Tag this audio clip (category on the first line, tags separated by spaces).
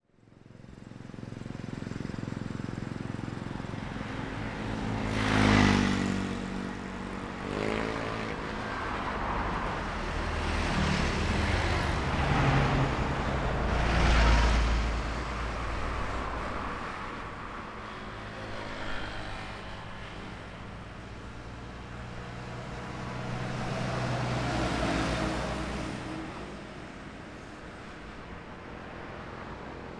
Soundscapes > Urban
ambience atmosphere Balayan birds car cars engine engines field-recording highway honking horn jeepney motorcycle motorcycles people Philippines police-siren road soundscape suburban traffic trucks vehicles voices